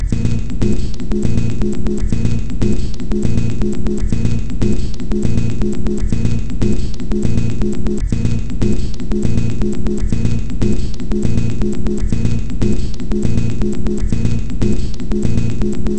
Instrument samples > Percussion
This 120bpm Drum Loop is good for composing Industrial/Electronic/Ambient songs or using as soundtrack to a sci-fi/suspense/horror indie game or short film.
Alien, Weird, Packs, Dark, Soundtrack, Loopable, Drum